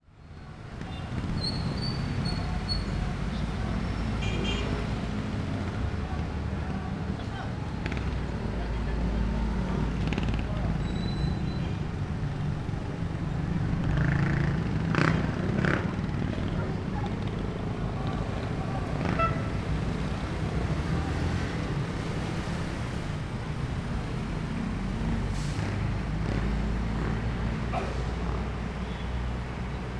Soundscapes > Urban
Traffic in Calapan city, Philippines. (Take 2) I made this recording from a balcony located in Calapan city (Oriental Mindoro, Philippines), right in front of Xentro Mall, at the end of the afternoon. One can hear quite heavy traffic with lots of vehicles (cars, motorcycles, some trucks and some jeepneys) passing by and onking at times, as well as the voices of some people coming in or going out the mall. From time to time, one can also hear men shouting to invite passengers to come in their jeepney or tricycle, as well as a bus honking (starting at #4:35), calling passengers going to Bulalacao. Recorded in August 2025 with a Zoom H5studio (built-in XY microphones). Fade in/out applied in Audacity.